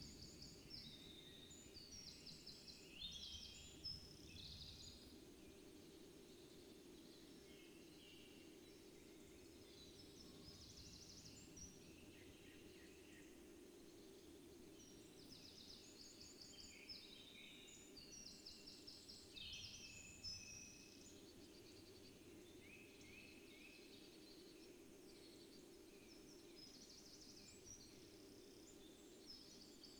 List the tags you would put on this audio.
Soundscapes > Nature
sound-installation natural-soundscape field-recording data-to-sound modified-soundscape nature weather-data alice-holt-forest Dendrophone artistic-intervention soundscape phenological-recording raspberry-pi

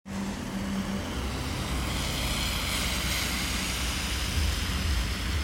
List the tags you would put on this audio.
Sound effects > Vehicles
tampere rain

